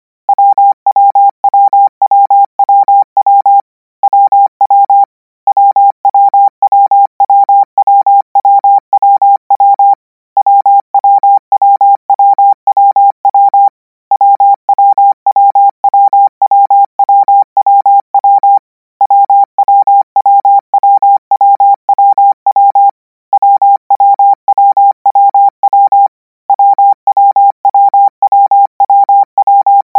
Sound effects > Electronic / Design
Koch 11 W - 200 N 25WPM 800Hz 90%
Practice hear letter 'W' use Koch method (practice each letter, symbol, letter separate than combine), 200 word random length, 25 word/minute, 800 Hz, 90% volume.
morse, code, letters